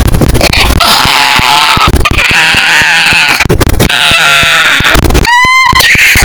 Speech > Solo speech
Girl Screaming & Screeching
clicking, screaming, screeching